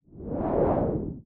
Sound effects > Electronic / Design
NOISE SWEEP LOW
swoosh, pass-by, ui, whoosh